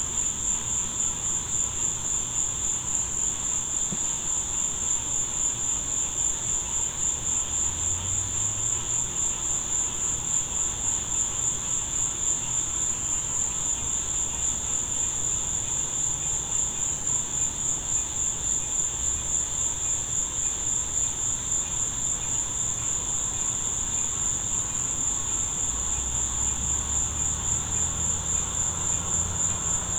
Soundscapes > Urban
Southern Alabama Golf Course at night. Next to an apartment complex with passing, distant traffic. Frogs, insects, crickets.